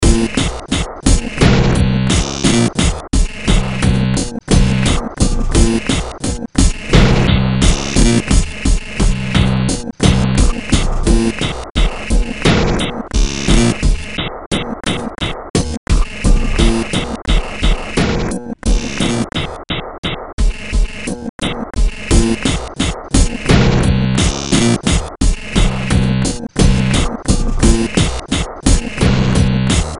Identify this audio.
Music > Multiple instruments
Demo Track #3277 (Industraumatic)
Sci-fi, Ambient, Games